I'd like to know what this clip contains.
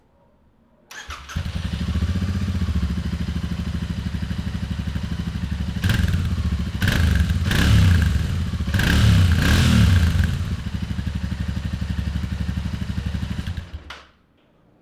Sound effects > Vehicles
Vehicles, Motorcycle, Royal Enfield - Continental GT 650 take 2
Royal Enfield - Continental GT 650